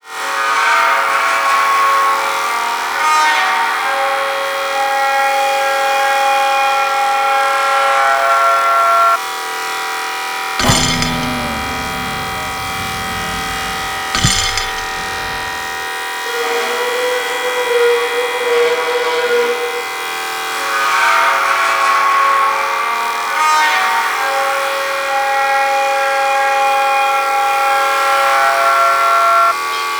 Sound effects > Experimental
Konkret Jungle 16
From a pack of samples focusing on ‘concrete’ and acousmatic technique (tape manipulation, synthetic processing of natural sounds, extension of “traditional” instruments’ timbral range via electronics). This excerpt is based upon multiple samples of bowed cymbals, overlapping one another via the 'morph control' on a Soundhack Morphagene module, and supplemented with additional layers of electronic feedback.